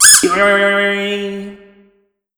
Sound effects > Other
A comedic clown bulb horn and sproing.